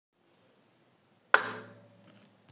Instrument samples > Percussion
Drum hit 2
A small drum i recorded.
Drum, Sample, Hit